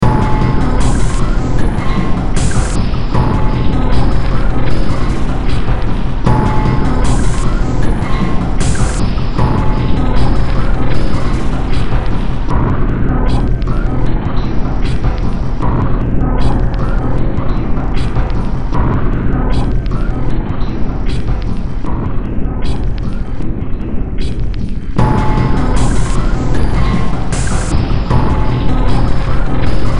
Music > Multiple instruments
Demo Track #3695 (Industraumatic)
Soundtrack, Cyberpunk, Industrial, Ambient, Sci-fi, Underground, Horror